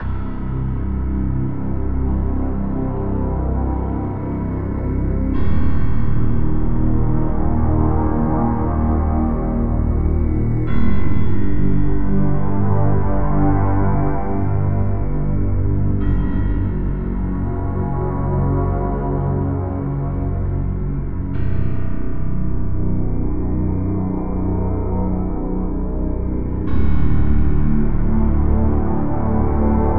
Music > Multiple instruments
Horror Texture (Dark Summoning)
chilling-drone, chilling-tone, halloween, horror-atmosphere, horror-drone, horror-texture, lux-aeterna-audio, ominous-drone, ominous-tone, ritual, scary, scary-atmosphere, scary-drone, scary-texture, scary-tone, sinister-vibes, spooky, spooky-atmosphere, spooky-drone, spooky-texture, summoning-ritual, unsettling-drone